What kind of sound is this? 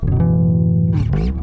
Music > Solo instrument
rich chord warm 2
fuzz, lowend, riffs, slide